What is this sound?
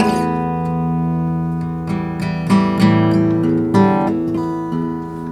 Music > Solo instrument
Acoustic Guitar Oneshot Slice 70
guitar, plucked, notes, knock, sfx, note, chord, oneshot, strings, string, foley, fx, acoustic, twang, pluck